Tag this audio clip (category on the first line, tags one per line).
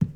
Sound effects > Objects / House appliances
bucket
carry
clatter
cleaning
drop
foley
handle
hollow
household
knock
lid
liquid
pail
plastic
pour
scoop
shake
slam
spill
tip
tool
water